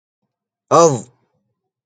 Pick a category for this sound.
Sound effects > Other